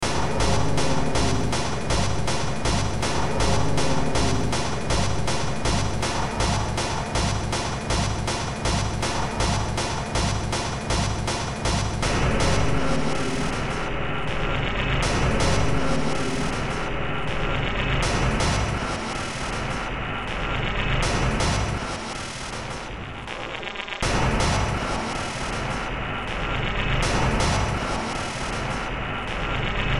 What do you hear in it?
Music > Multiple instruments
Short Track #3094 (Industraumatic)
Ambient, Cyberpunk, Games, Horror, Industrial, Noise, Sci-fi, Soundtrack, Underground